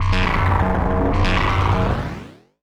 Sound effects > Experimental
Analog Bass, Sweeps, and FX-149
synth, pad, sfx, analogue, korg, bassy, dark, sample, weird, mechanical, basses, alien, sweep, electronic, analog, snythesizer, retro, fx, complex, electro, sci-fi, scifi, effect, machine, bass, robotic, vintage, oneshot, trippy, robot